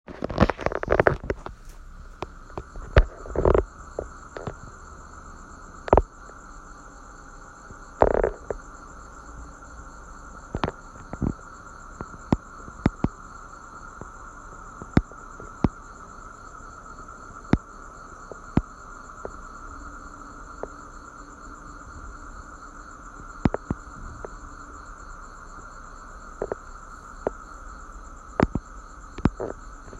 Soundscapes > Nature
Âm Thanh Đêm Sau Cơn Mưa - Night Sound After Rain
Night sound from bath room window after rain. Record use iPhone 7 Plus smart phone 2025.10.08 00:53
night, rain, environment, nature